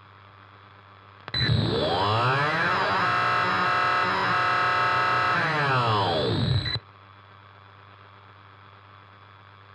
Sound effects > Electronic / Design
LW MONO 01
I recorded this signal using oldtimer 1968 radio with LW band and MKH8060 to pick up.
analog, laser, LW, noise, Radio, sci-fi, signal, static